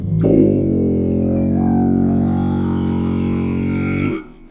Sound effects > Experimental
Creature Monster Alien Vocal FX-61
gamedesign, Sounddesign